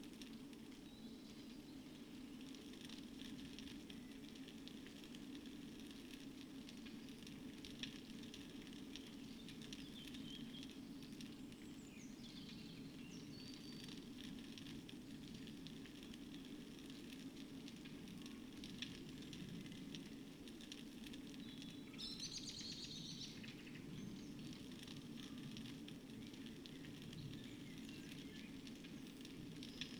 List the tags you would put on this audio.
Soundscapes > Nature
raspberry-pi artistic-intervention Dendrophone weather-data data-to-sound modified-soundscape natural-soundscape alice-holt-forest soundscape nature field-recording sound-installation phenological-recording

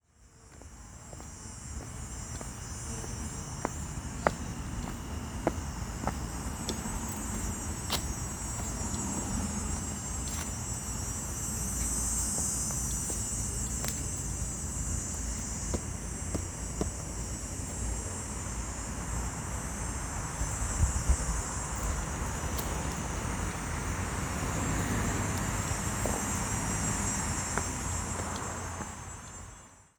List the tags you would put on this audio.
Soundscapes > Nature
walk ambient grass walking ambience leaves Interlochen courtyard soundscape field-recording noise traffic campus field

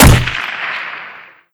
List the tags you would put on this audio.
Sound effects > Other mechanisms, engines, machines
action army AssaultCube attack bullet dvids dvidshub explosion firearm firing fps game gaming gun kill military pistol plig rifle shoot shooter shooting shot sniper war warfare weapon

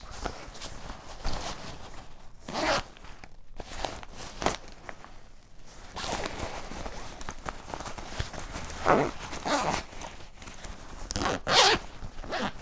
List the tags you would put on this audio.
Sound effects > Objects / House appliances
foley duffle backpack close-field-mic gear stuffing equipment zipper bag

